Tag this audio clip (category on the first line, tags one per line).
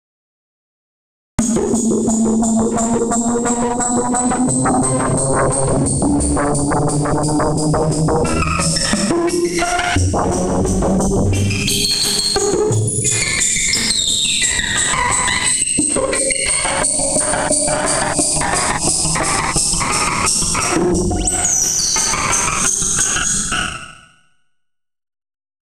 Music > Solo percussion
Interesting-Results
Bass-Drum
Silly
FX-Drum-Pattern
Noisy
Bass-and-Snare
Snare-Drum
Experimental-Production
FX-Laden
FX-Drums
Experiments-on-Drum-Patterns
Simple-Drum-Pattern
Experimental
FX-Laden-Simple-Drum-Pattern
FX-Drum
Fun
Four-Over-Four-Pattern